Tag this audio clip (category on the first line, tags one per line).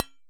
Sound effects > Objects / House appliances
percusive
recording
sampling